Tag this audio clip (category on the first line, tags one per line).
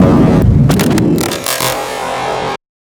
Sound effects > Other mechanisms, engines, machines
sound
robotic
hydraulics
processing
feedback
motors
operation
gears
machine
metallic
actuators
servos
clicking
circuitry